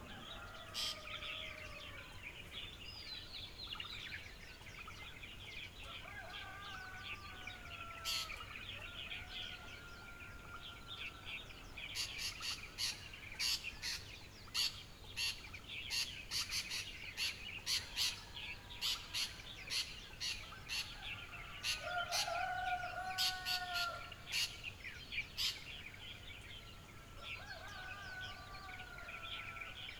Soundscapes > Nature
Ambient of a village on a spring morning
A simple recording showcases the atmosphere and characteristics of rural areas in the early morning. The sound was recorded around 6:30 in the morning.
airplane, ambient, atmosphere, background, birds, calm, car, countryside, dawn, field-recording, insects, morning, nature, outdoor, outside, recording, rooster, rural, soundscape, spring, village, water